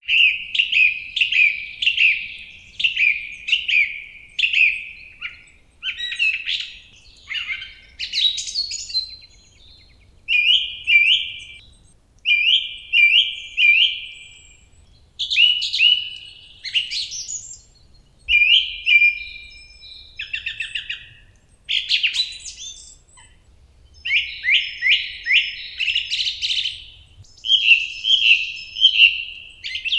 Sound effects > Animals
Beautiful birdsong 7
Beautiful bird song recording recorded in a Polish forest. Sounds of birds singing in their natural habitat. No human or mechanical sounds. Effects recorded from the field.